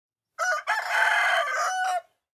Sound effects > Animals
Sound of Indian Rooster. Recorded using H6n.
birds, india, Rooster